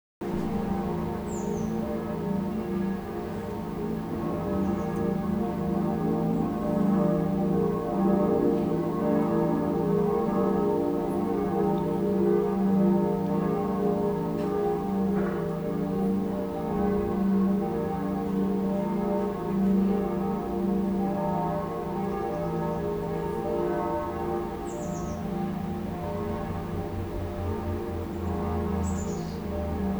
Soundscapes > Urban
Church bells ringing from a small church in a village. Sounds of people and some traffic can be heard. Birds are chirping and ravens are cawing. An idyllic lazy Sunday in the village.